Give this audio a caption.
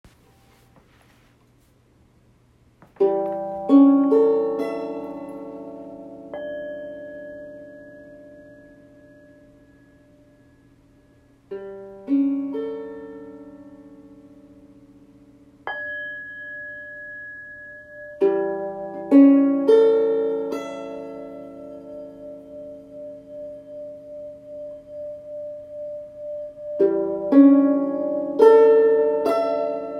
Instrument samples > String
badly tuned mandolin
Messing around with a friend on my very out-of-tune mandolin. Introspective and nostalgic sounding. I just love the sound of detuned strings.
detuned, mandolin